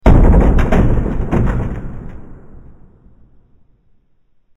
Sound effects > Natural elements and explosions
Deep Explosion with Falling Debris
Slowed down audio of items falling to make it sound like an explosion.
bang, detonation, blast, bomb, explode